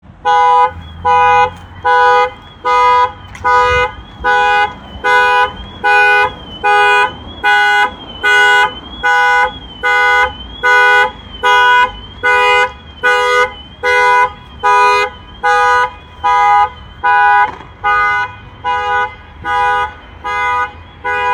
Sound effects > Vehicles
The sound of a car alarm. Recorded in West London on the 6th of September 2025 using a Google Pixel 9a phone. I want to share them with you here.